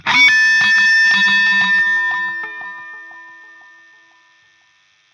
Instrument samples > String
"High-gain electric guitar lead tone from Amplitube 5, featuring a British Lead S100 (Marshall JCM800-style) amp. Includes noise gate, overdrive, modulation, delay, and reverb for sustained, aggressive sound. Perfect for heavy rock and metal solos. Clean output from Amplitube."
electric, guitar, disrtorted